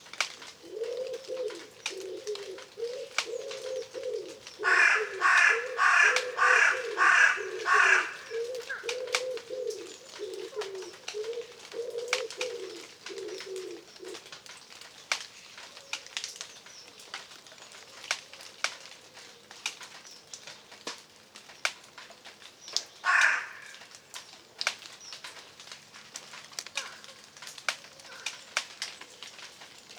Soundscapes > Nature
Carrion Crow with Wood Pigeon in Rain
Carrion crow calling, accompanied by a wood pigeon at the start. This was recorded during the first significant rainfall in the area for some time. The time is about 06:15 in the morning. Recorded with a Zoom F3 and Earsight standard microphones. The mics were taped to the underside of two branches of a tree in a suburban garden.